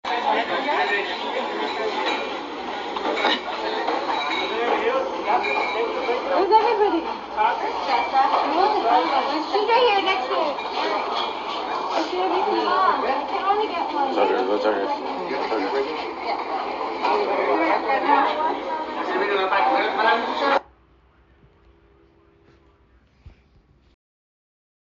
Sound effects > Human sounds and actions
family shopping
family vacation walking outlets.
shop, public, vacation, family